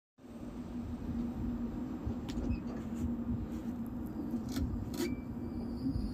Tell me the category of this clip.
Soundscapes > Urban